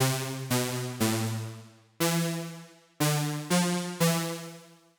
Instrument samples > Synths / Electronic
Golden Low Pulse
A short, low-pitched tone with a warm base around 167 Hz, layered with brighter overtones that give it a clear, slightly crisp character
low, short